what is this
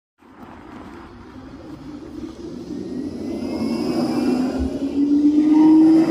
Soundscapes > Urban
final tram 3
finland, hervanta, tram